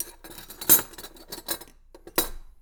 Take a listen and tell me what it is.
Sound effects > Objects / House appliances
knife and metal beam vibrations clicks dings and sfx-062
a collection of sounds made with metal beams, knives and utensils vibrating and clanging recorded with tascam field recorder and mixed in reaper
Wobble, ting, FX, Foley, ding, Vibrate, Beam, Clang, Metal, SFX, Trippy, Vibration, Perc, metallic, Klang